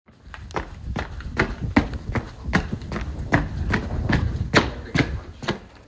Sound effects > Human sounds and actions
Sound of Running Steps.